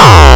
Instrument samples > Percussion
Retouched the Ekit kick in Flstudio. Processed with Zl EQ and Waveshaper. A simple kick I made, and enjoyable music you make :).